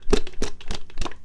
Sound effects > Objects / House appliances
A "Milk Carton" being hit and smacked on a surface in various ways recorded with a simple usb mic. Raw.
carton, clack, click, foley, industrial, plastic